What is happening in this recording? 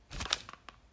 Sound effects > Objects / House appliances
The sound of picking up a pistol from a fabric surface. Sound was created by picking up a calculator from my sofa
grabbing, gun, pistol, Weapon